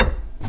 Sound effects > Other mechanisms, engines, machines
metal shop foley -015
fx, bop, bam, little, knock, percussion, sfx, tools, foley, thud, metal, crackle, rustle, shop, boom, perc, sound, pop, oneshot, strike, tink, wood, bang